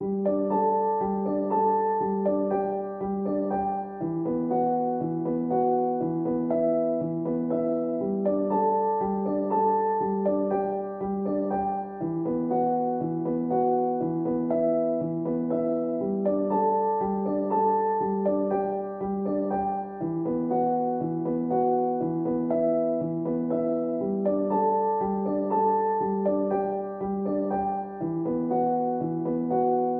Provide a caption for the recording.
Music > Solo instrument
Piano loops 197 octave short loop 120 bpm
simple, music, free, 120bpm, 120, piano, samples, simplesamples, loop, reverb, pianomusic